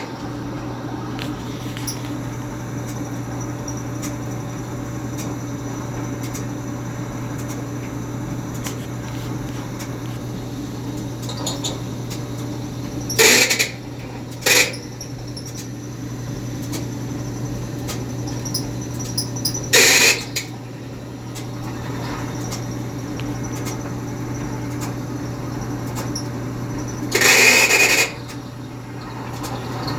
Sound effects > Objects / House appliances
Belt going out on a maytag clothes dryer that I am certain belongs to satan. Dry, no effects or filter. Recorded with a samsung phone. May gawd have mercy on your soul. Squealing squeaking shrieking squalling cat scream banshee clothes dryer.